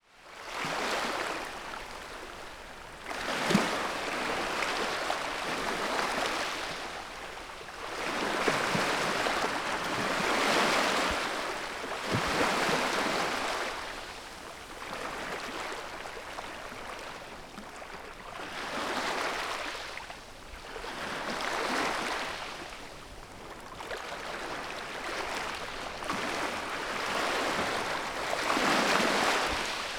Soundscapes > Nature
250814 210715 PH Masasa beach at night
Masasa Beach at night. I made this recording at night, on a beautiful sand beach called Masasa beach, located in the south of Tingloy island, in Batangas province, Philippines. One can hear waves and wavelets lapping the sand, and a cricket in the background. Recorded in August 2025 with a Zoom H5studio (built-in XY microphones). Fade in/out applied in Audacity.
ambience, atmosphere, beach, calm, coast, cricket, evening, field-recording, island, night, ocean, Philippines, relaxing, sand, sea, seaside, shore, soundscape, tingloy, water, wavelets, waves